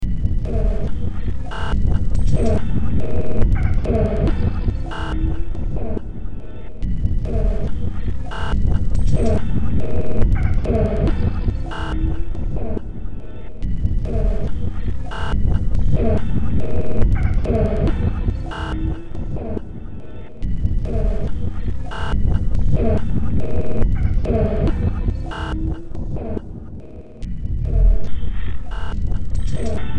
Music > Multiple instruments
Demo Track #3816 (Industraumatic)
Cyberpunk, Horror